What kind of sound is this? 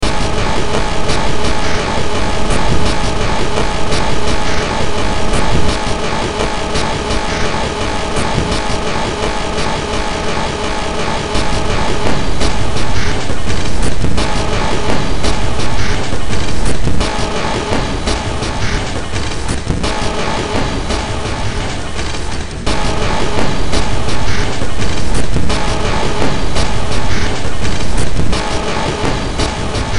Music > Multiple instruments
Short Track #3299 (Industraumatic)
Soundtrack, Horror, Industrial, Ambient, Noise, Sci-fi, Games, Cyberpunk, Underground